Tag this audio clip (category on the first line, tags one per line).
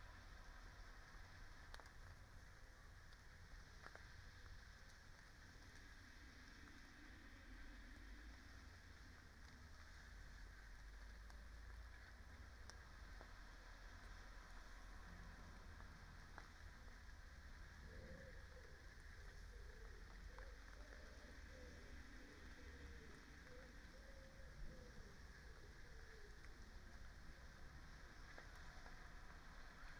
Soundscapes > Nature
sound-installation
Dendrophone
alice-holt-forest
field-recording
phenological-recording
nature
data-to-sound
soundscape
weather-data
raspberry-pi
artistic-intervention
modified-soundscape
natural-soundscape